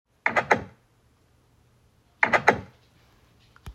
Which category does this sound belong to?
Sound effects > Vehicles